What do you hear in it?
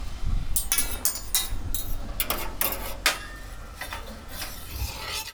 Sound effects > Objects / House appliances
Junkyard Foley and FX Percs (Metal, Clanks, Scrapes, Bangs, Scrap, and Machines) 119
Dump, Perc, Environment, Machine, Metal, Metallic, Bash, SFX, Robot, Bang, Percussion, waste, Atmosphere, scrape, Junk, Robotic, Smash, rattle, garbage, Ambience, dumpster, tube, rubbish, Clank, FX, Junkyard, trash, dumping, Foley, Clang